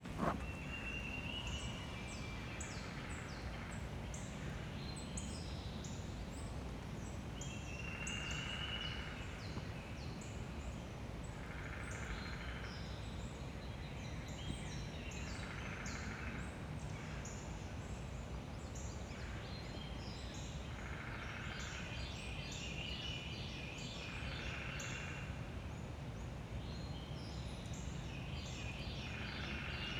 Soundscapes > Nature
Field Recording. Woods in North Georgia, United States. Birds chattering. Woodpecker. Recorded on April 7th, 2025 with iPhone 11, version 16.2 using Voice Memos application. No additional devices used in recording, no microphones, mufflers, etc. No editing, No modifications, Pure sound, Pure nature. Low rumbling white noise, distant but feint ambiance of traffic--but doesn't really take away from the relaxing vibe of the grove.